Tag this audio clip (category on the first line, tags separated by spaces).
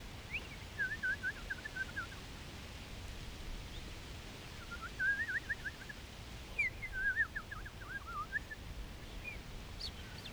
Soundscapes > Nature
ambiance ambience ambient bird birds birdsong field-recording forest nature spring trees wind